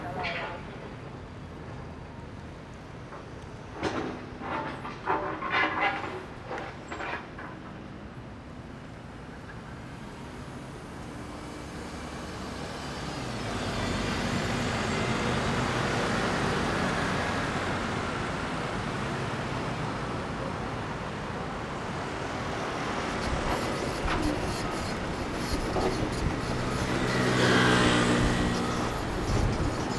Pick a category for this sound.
Soundscapes > Urban